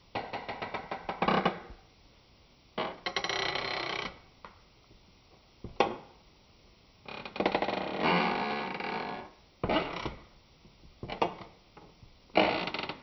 Sound effects > Other
Creaking wood. Recorded with my phone.